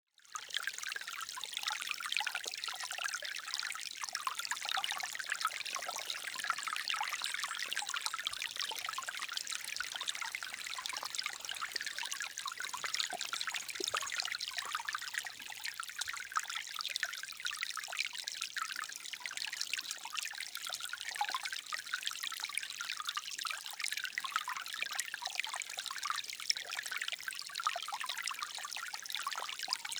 Soundscapes > Nature
Small snow melt rivulet entering beaver pond, Beaver Flats west of Bragg Creek, Alberta. April 4, 2025. 1200 MDT
Recorded April 4, 2025 1200 MDT at Beaver Flats Ponds west of Bragg Creek Alberta. Small snow melt trickle entering beaver pond. 15° C, sunny, low winds. Recorded with Rode NTG5 supercardoid shotgun microphone in Movo blimp on pole, deadcat wind protection. Mono. Low cut 100 Hz, normalization, content cuts, and fades in/out in Izotope RX10. Thankyou!
babbling, brook, creek, gurgle, trickle